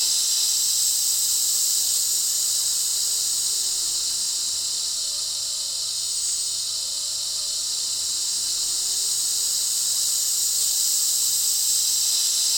Sound effects > Human sounds and actions
AIRHiss-Blue Snowball Microphone, CU Steady, Human Imitation, Looped Nicholas Judy TDC
Steady air hiss. Human imitation. Looped. Comical.
Steady, Human, comical, Blue-brand, air, loop, imitation, hiss, Blue-Snowball